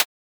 Instrument samples > Synths / Electronic
An IDM topper style percussion hit made in Surge XT, using FM synthesis.
fm, electronic